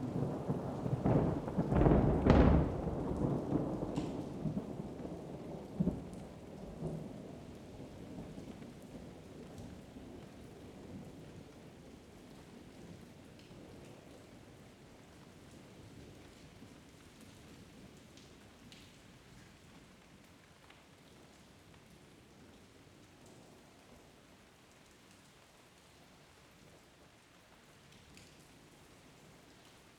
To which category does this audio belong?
Sound effects > Natural elements and explosions